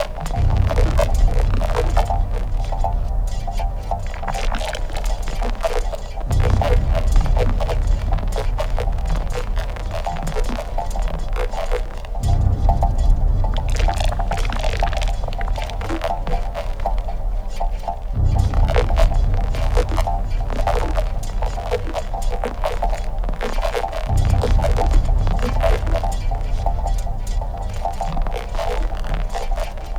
Soundscapes > Synthetic / Artificial
Atonal Background Granular Noise Texture
Experimental atonal noise granular texture produced with Torso S4